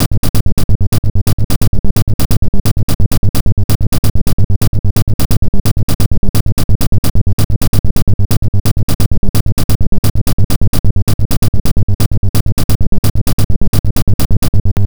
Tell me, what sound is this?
Music > Other
Techno Loop 130 bpm
This is a loop made with distorted kicks and with a variation of 1/4 bt gate preset of the Gross beat plugin, it can be used for a electronic music track with and industrial vibe. 130 pbm.
130bpm, gabber, kick